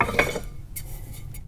Music > Solo instrument
Marimba Loose Keys Notes Tones and Vibrations 7
percussion, block, marimba, foley, wood, thud, rustle, oneshotes, tink, fx, notes, keys, woodblock, perc, loose